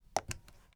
Sound effects > Other mechanisms, engines, machines
gun handle 1
soft, handgun, wood, gun, table, scrape, handle